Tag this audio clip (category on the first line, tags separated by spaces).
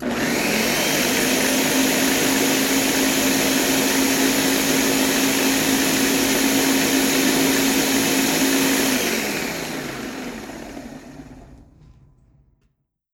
Sound effects > Objects / House appliances
blend blender low-speed Phone-recording turn-off turn-on